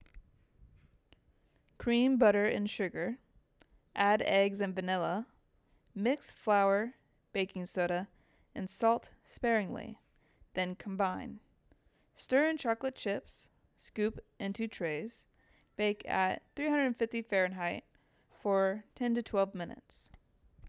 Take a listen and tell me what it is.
Speech > Solo speech
How to Bake Chocolate Chip Cookies
A fun, mouth-watering script for baking classic chocolate chip cookies. Ideal for kitchen tutorials and cooking videos. Script: "Cream butter and sugar. Add eggs and vanilla. Mix flour, baking soda, and salt separately, then combine. Stir in chocolate chips. Scoop onto trays. Bake at 350°F for 10–12 minutes. Delicious!"
BakingTips, PublicGuide, VoiceOver, SweetLife, CookiesRecipe, HowTo, HomeBaking